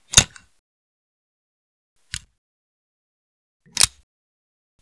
Sound effects > Other mechanisms, engines, machines
410 Shotgun loading sounds
This is me opening my 410 shotgun, loading a blank shell in, and closing the shotgun back up.